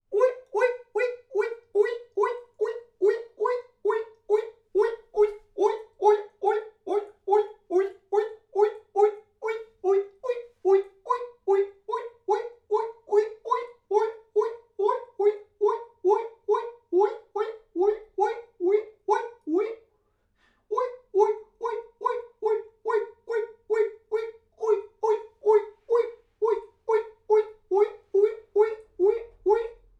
Sound effects > Human sounds and actions
Alien - Cheer 8 Oink
Alien / Weird / Other worldly or fake-culture like applauses. I should have stuck to one kind of either bops or chicks or "ayayayay" rather than making multiple different kinds, the result would have been more convincing. Also only 13 different takes is a little cheesy. I find those applause type things need around 20-30. A series of me recording multiple takes in a medium sized bedroom to fake a crowd. Clapping/talking and more original applause types, at different positions in the room. Recorded with a Rode NT5 XY pair (next to the wall) and a Tascam FR-AV2. Kind of cringe by itself and unprocessed. But with multiple takes mixed it can fake a crowd. You will find most of the takes in the pack.
Alien, alternate, applause, experimental, FR-AV2, individual, indoor, NT5, original, person, Rode, single, solo-crowd, Tascam, weird, XY